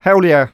Speech > Solo speech
Joyful - Hell yeah 2
dialogue, excited, FR-AV2, happy, Human, joy, joyful, Male, Man, Mid-20s, Neumann, NPC, oneshot, singletake, Single-take, talk, Tascam, U67, Video-game, Vocal, voice, Voice-acting